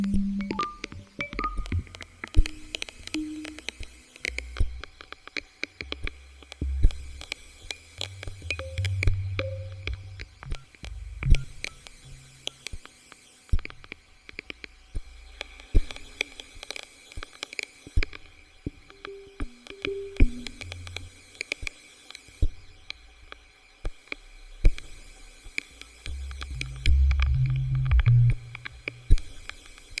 Sound effects > Electronic / Design
Dustmite Chorale 8
The 'Dustmites Chorale' pack from my 'Symbiotes' sampler is based on sounds in which the dominant feature is some form of surface noise, digital glitch, or tape hiss - so, taking those elements we try to remove from studio recordings as our starting point. This excerpt features some randomized, harmonic 'droplets' and mild plastic crumpling with extra flavoring from a granular delay module.